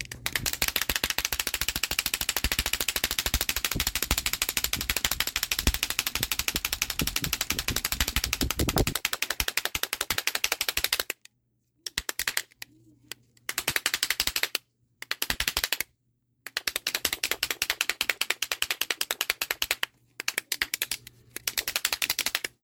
Sound effects > Other
TOONShake-Samsung Galaxy Smartphone, CU Teeth Chattering Nicholas Judy TDC
Teeth chattering. Recorded at Dollar Tree.
Phone-recording, teeth, chatter